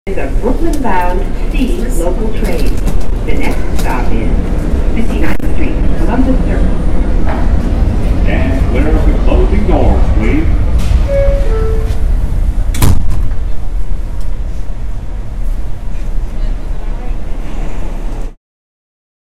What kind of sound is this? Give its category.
Soundscapes > Urban